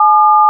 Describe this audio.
Sound effects > Electronic / Design
This is the number 7 in DTMF This is also apart of the pack 'DTMF tones 0-9'
dtmf, telephone